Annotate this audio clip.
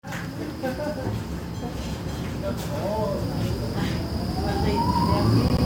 Soundscapes > Urban
Sound of tram moving near a stop in Tampere. Recorded with Apple iPhone 15.
tram, streetcar, transport